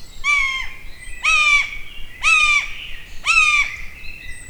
Sound effects > Animals
Unidentified bird XY - 250418-14h10ish Gergueil
Subject : A bird in the "Combe du moulin". Date YMD : 2025 04 18 14h10 Location : Gergueil France. Hardware : Zoom H5 stock XY capsule. Weather : Processing : Trimmed and Normalized in Audacity.
Bird, chrip, H5, nature, unidentified, XY, Zoom